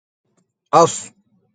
Sound effects > Other
sod-sisme
arabic, male, vocal, voice